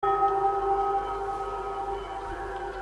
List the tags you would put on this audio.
Sound effects > Objects / House appliances
bell
ding
gong

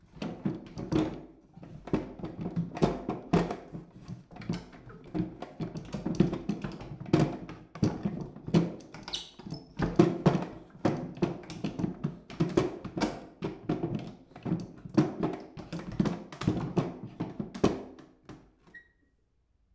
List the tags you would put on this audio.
Sound effects > Objects / House appliances
drawer
furniture
wood
wooden

